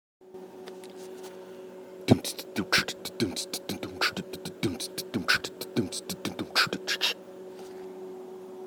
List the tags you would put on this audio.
Music > Solo percussion
Beatbox Drumloop Drumnbass Hihat Jungle Livedrums Mouth

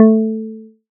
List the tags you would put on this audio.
Instrument samples > Synths / Electronic
additive-synthesis pluck fm-synthesis

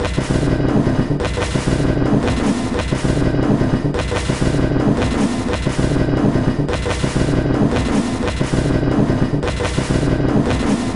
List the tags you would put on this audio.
Instrument samples > Percussion
Alien Dark Drum Industrial Loop Loopable Samples Soundtrack Underground Weird

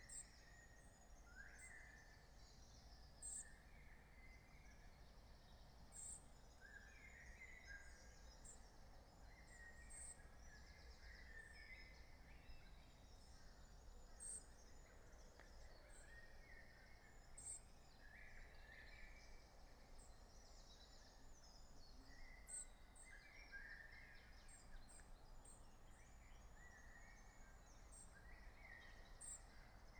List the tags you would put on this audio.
Soundscapes > Nature
modified-soundscape weather-data sound-installation Dendrophone alice-holt-forest artistic-intervention field-recording phenological-recording natural-soundscape raspberry-pi data-to-sound soundscape nature

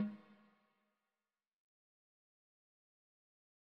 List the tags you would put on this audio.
Music > Solo percussion
acoustic crack drum drums hits kit processed snare snaredrum

